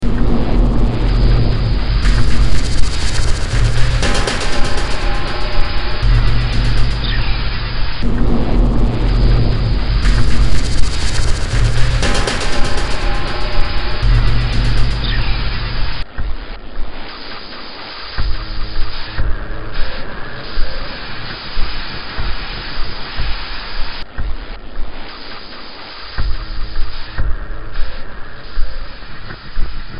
Music > Multiple instruments
Demo Track #3890 (Industraumatic)
Ambient, Cyberpunk, Games, Horror, Industrial, Noise, Sci-fi, Soundtrack, Underground